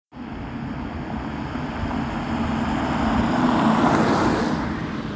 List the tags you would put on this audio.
Sound effects > Vehicles
asphalt-road passing-by moderate-speed car studded-tires wet-road

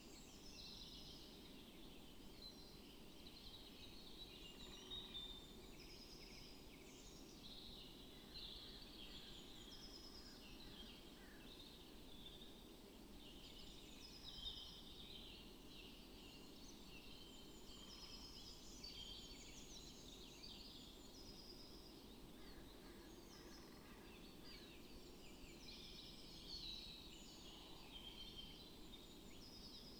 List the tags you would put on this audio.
Soundscapes > Nature
modified-soundscape Dendrophone weather-data sound-installation soundscape field-recording natural-soundscape alice-holt-forest data-to-sound artistic-intervention nature raspberry-pi

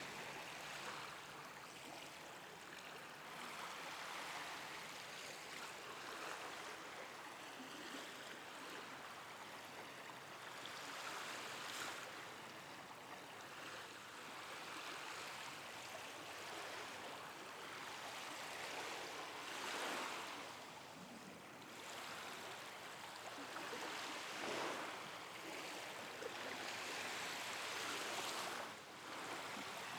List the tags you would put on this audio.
Soundscapes > Nature
AMBIENCE CALM OUTDOOR SEA

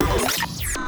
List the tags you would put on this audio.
Sound effects > Electronic / Design
stutter hard pitched glitch digital one-shot

